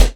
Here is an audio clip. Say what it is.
Instrument samples > Percussion
hi-hatized crash blue 1
It's a bass hi-hat. This is a bass hi-hat wavefile based on a namesake re-enveloped crash file you can find in my crash folder.
dark-crisp, brass, click, picocymbal, Meinl, tick, minicymbal, Sabian, Istanbul, hi-hat, drums, hat, bronze, metal, chick-cymbals, facing-cymbals, Zildjian, crisp, closed-cymbals, cymbal-pedal